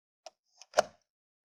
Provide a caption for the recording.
Sound effects > Objects / House appliances
Inserted the plug into the socket
I recorded this sound when I plugged in a table lamp. Recorded on a Galaxy Grand Prime.